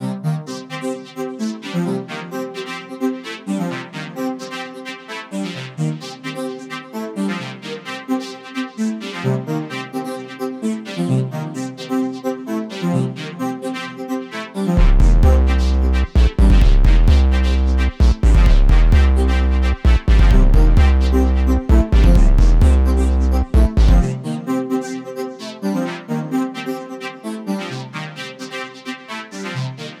Music > Other
Happy harmony (main menu music 2) (loopable)
I`ve made my third song, i think, that this song is not perfect, but it will help you while making games, i want to be famous
Happy, Harmony, Music